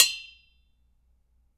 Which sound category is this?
Sound effects > Objects / House appliances